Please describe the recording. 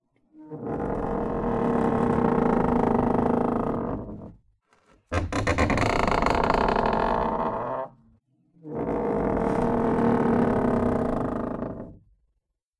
Sound effects > Objects / House appliances
A large wooden door being swung slowly, making a very loud creaking sound